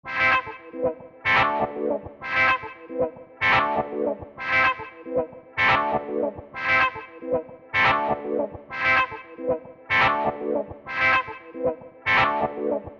Music > Solo instrument
Ableton Live. VST.Purity......Guitar 111 bpm Free Music Slap House Dance EDM Loop Electro Clap Drums Kick Drum Snare Bass Dance Club Psytrance Drumroll Trance Sample .
111, bpm, Club, Dance, delay, Drumroll, Guitar, Psytrance, Sample, Trance